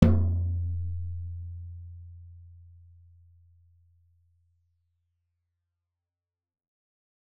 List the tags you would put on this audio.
Music > Solo percussion
drums
drum
oneshot
instrument
tom
tomdrum
beatloop
percussion
beats
roll
kit
fill
drumkit
perc
acoustic
flam
rim
percs
rimshot
velocity
studio
floortom
toms
beat

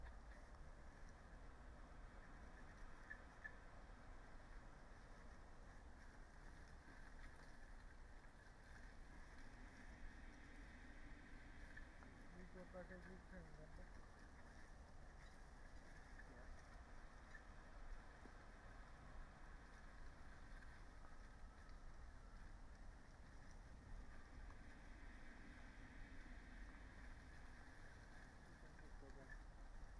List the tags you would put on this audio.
Soundscapes > Nature
alice-holt-forest
data-to-sound
field-recording
raspberry-pi
natural-soundscape
sound-installation
phenological-recording
Dendrophone
artistic-intervention
soundscape
nature
modified-soundscape
weather-data

